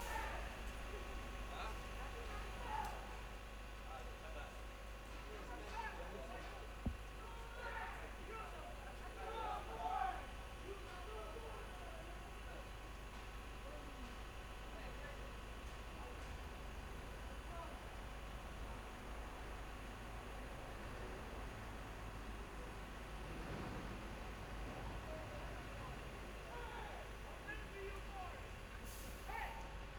Soundscapes > Urban
Recorded a bus stop on a busy street during the day in Wood Green, London. Useful for street ambiences, busy streets and bus stops. Recorded with Reynolds 2nd Order Ambisonics microphone, the audio file has 9 tracks, already encoded into B-Format Ambisonics. Can be encoded into binaural format.